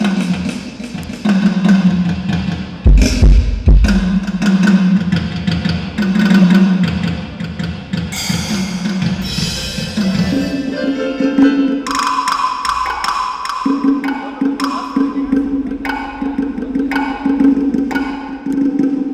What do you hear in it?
Instrument samples > Percussion
Sound check previous to concert
A sound check at an interior venue hours before doors opening, roadie checks electric drum set and other instruments mix.